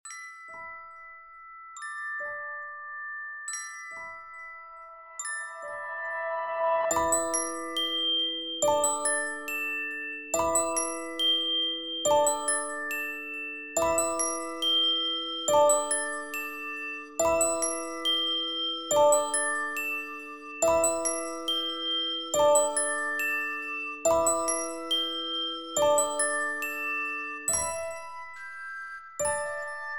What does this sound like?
Music > Multiple instruments
Butterflow Menu Music Theme
Main Menu Theme created for "Butterflow", a relaxing arcade side-scroller inspired by Flappy Bird where you play as a butterfly. You are welcome to post a link in the comments if you used it somewhere, I would love to check out whatever it is you made!